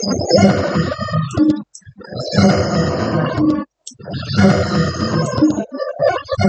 Sound effects > Electronic / Design
Stirring The Rhythms 5
dark-soundscapes, drowning, noise-ambient, vst, sci-fi, weird-rhythm, dark-techno, rhythm, glitchy-rhythm, science-fiction, scifi, PPG-Wave, industrial-rhythm, noise, wonky, sound-design, dark-design, content-creator